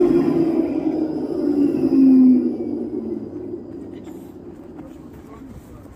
Soundscapes > Urban
final tram 11
finland, tram